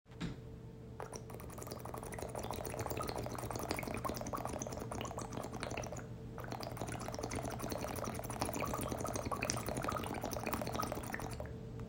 Sound effects > Electronic / Design
Soda machine dispensing liquid
Drink, fizz, liquid